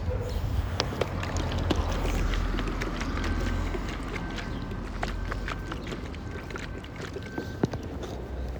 Sound effects > Human sounds and actions
drinking water fast